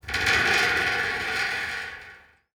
Sound effects > Other
An outdoor recording of a flexible fence wire being struck with fingers. Recorded with a Zoom H6 Studio and SSH-63e Mic.